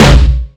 Instrument samples > Percussion
fatsnare dist 2
PDP, beat, Noble-Cooley